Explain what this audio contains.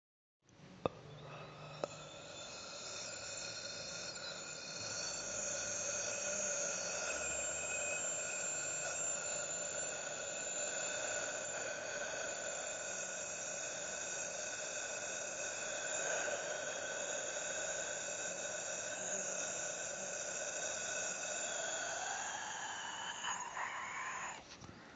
Sound effects > Human sounds and actions
Sucking air with ingressive whistle
Slowly sucking air through the mouth and producing a short ingressive whistle.
air, human, whistle